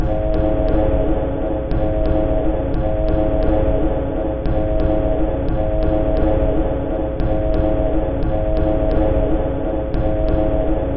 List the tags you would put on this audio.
Instrument samples > Percussion
Dark
Alien
Drum
Underground
Samples
Loopable
Industrial
Loop
Soundtrack
Weird
Packs
Ambient

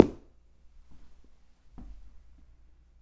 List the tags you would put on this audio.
Sound effects > Objects / House appliances
bonk
clunk
drill
fieldrecording
foley
foundobject
fx
glass
hit
industrial
mechanical
metal
natural
object
oneshot
perc
percussion
sfx
stab

